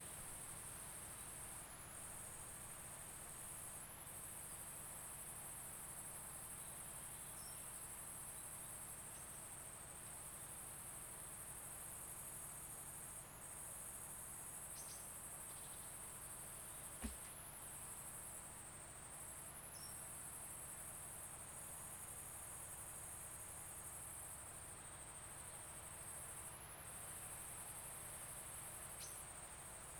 Soundscapes > Nature
Amb Afternoon
Recorded in a small village in the mountains with a Zoom H6n
birds, nature